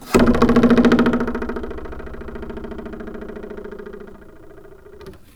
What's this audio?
Sound effects > Other mechanisms, engines, machines
Handsaw Beam Plank Vibration Metal Foley 5
metallic, saw, twangy, perc, hit, metal, handsaw, vibe, plank, shop, household, percussion, foley, sfx, twang, fx, vibration, smack, tool